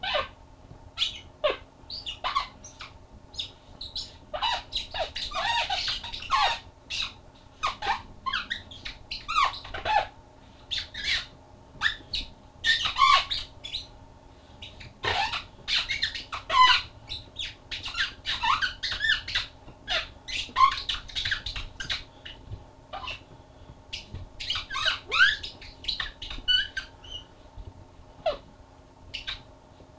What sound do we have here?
Sound effects > Objects / House appliances
RUBRFric-MCU Rubber Squeaking Nicholas Judy TDC
Blue-Snowball, cartoon, Blue-brand, foley, rubber, squeak